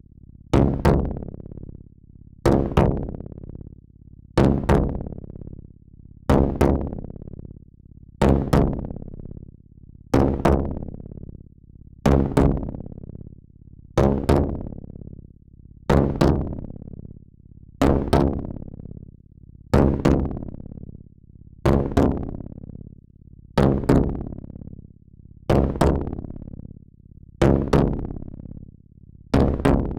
Instrument samples > Synths / Electronic
I synthesize sounds, textures, rhythmic patterns in ableton. Use it and get high.✩♬₊˚. These are sounds from my old synthesis sketches.
sound, minimal, sound-design, electronic, loop